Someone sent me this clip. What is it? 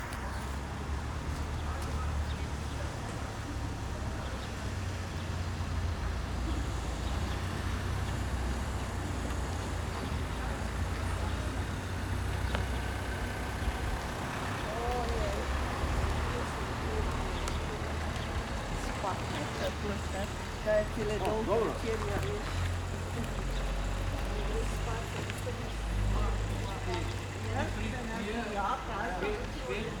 Soundscapes > Urban
City sounds of Tolmin (Slovenia) Sunday 8am by Church bell
It was recorded by IPhone 15 in city Tolmin (Slovenia), on sunday morning at 8am in midsummer.
Bell, church, City, morning, People, Slovenia, Street, talks, Tolmin